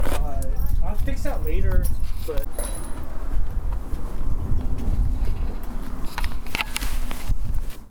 Sound effects > Objects / House appliances
Junkyard Foley and FX Percs (Metal, Clanks, Scrapes, Bangs, Scrap, and Machines) 149
Ambience, Atmosphere, Clank, Dump, dumpster, Environment, Foley, FX, garbage, Junk, Junkyard, Machine, Metallic, Perc, Percussion, rubbish, Smash, trash, tube, waste